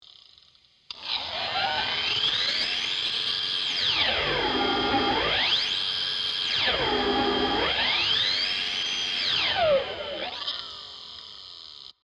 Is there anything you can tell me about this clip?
Sound effects > Experimental
weird machinery
Miniature vacuum recorded with automation to get a weird radio sound.
electronic, noise, radio, static